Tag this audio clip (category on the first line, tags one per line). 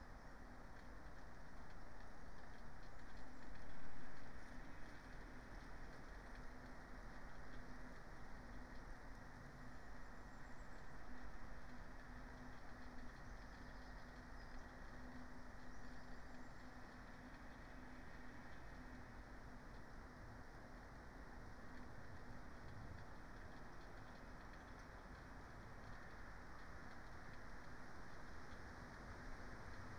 Soundscapes > Nature
data-to-sound
field-recording
phenological-recording
modified-soundscape
natural-soundscape
raspberry-pi
weather-data
alice-holt-forest
sound-installation
Dendrophone
nature
artistic-intervention
soundscape